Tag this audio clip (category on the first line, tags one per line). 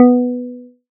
Instrument samples > Synths / Electronic
additive-synthesis
pluck
fm-synthesis